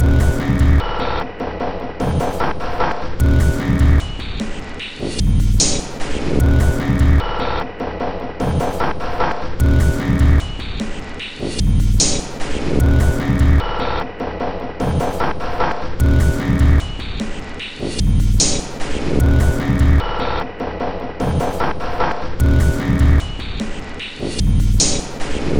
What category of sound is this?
Instrument samples > Percussion